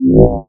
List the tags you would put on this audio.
Instrument samples > Synths / Electronic
additive-synthesis bass fm-synthesis

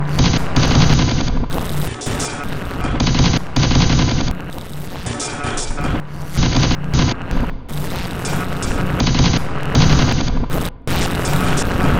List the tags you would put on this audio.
Instrument samples > Percussion
Ambient Samples Loopable Underground Packs Dark Weird Soundtrack Industrial Alien Drum Loop

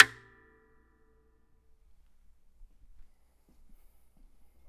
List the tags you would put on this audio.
Sound effects > Objects / House appliances
bonk clunk drill fieldrecording foley foundobject fx glass hit industrial mechanical metal natural object oneshot perc percussion sfx stab

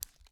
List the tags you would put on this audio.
Sound effects > Experimental
bones foley onion punch thud vegetable

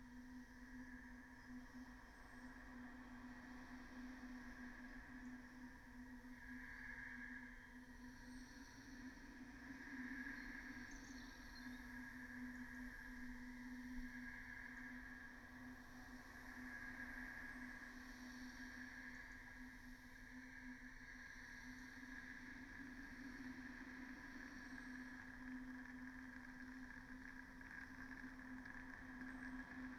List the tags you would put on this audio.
Soundscapes > Nature
alice-holt-forest modified-soundscape data-to-sound weather-data artistic-intervention nature sound-installation Dendrophone soundscape phenological-recording natural-soundscape raspberry-pi field-recording

